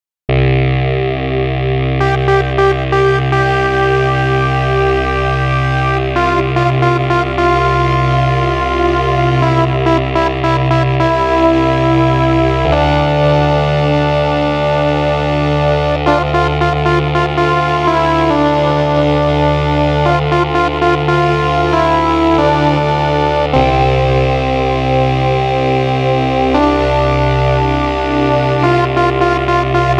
Soundscapes > Other
Epic soundtrack
This melody could fit for a scene of an indie movie for a preparation for a battle, because it has that mystical vibe to it, or a soundtrack for a intro for a powermetal.
heroic, soundtrack, epic, battle